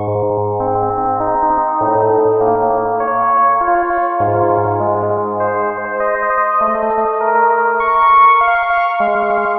Music > Solo instrument
Dramatic piano/pad riser 140bpm
Made in FL Studio with Flex, and used Valhalla Supermassive for reverb/pad effect. Leave a rating if you like it Use for anything :)
140bpm, ambient, dark, liminal, loop, Pad, riser, synth